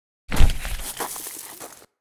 Sound effects > Human sounds and actions
Human jumping down to gravel w/ impact
Crispy impact of a human jumping down from a carriage or some other place onto gravel. Made from the two attached sound references.